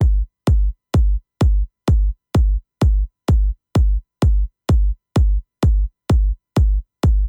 Instrument samples > Synths / Electronic
128 kick drum
Basic drum beat created with a soft synth.